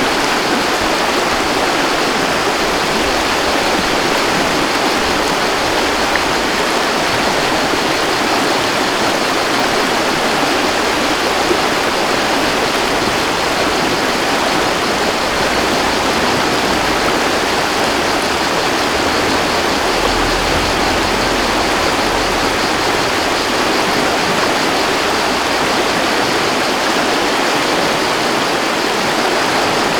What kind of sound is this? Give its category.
Sound effects > Natural elements and explosions